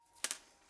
Sound effects > Objects / House appliances
Coin Foley 5
coin foley coins change jingle tap jostle sfx fx percusion perc
jingle, change, sfx, jostle, tap, fx, coins, foley, perc, coin, percusion